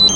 Sound effects > Electronic / Design
A barcode scanner beeping. Melodic. Recorded at Lowe's.